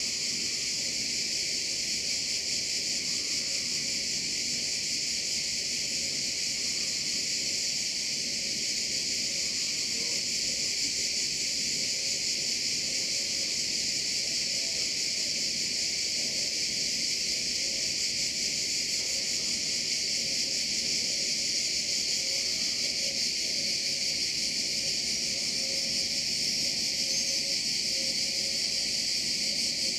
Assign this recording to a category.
Soundscapes > Nature